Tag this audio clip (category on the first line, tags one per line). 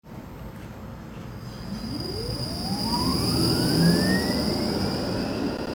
Soundscapes > Urban
streetcar
tram